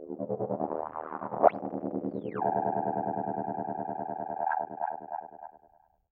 Sound effects > Experimental
Analog Bass, Sweeps, and FX-095
from a collection of analog synth samples recorded in Reaper using multiple vintage Analog synths alongside analog delay, further processing via Reaper
analog, basses, bassy, effect, electro, electronic, korg, oneshot, pad, retro, sample, sfx, sweep, trippy, weird